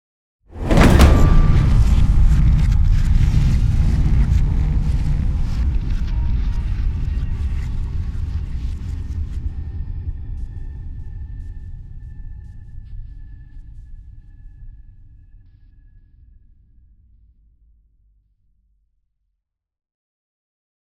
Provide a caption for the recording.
Sound effects > Other
Sound Design Elements Impact SFX PS 056
explosion, audio, transient, crash, shockwave, effects, cinematic, rumble, smash, impact, design, force, strike, sound, percussive, blunt, thudbang, hit, game, sfx, sharp, hard, collision, power, heavy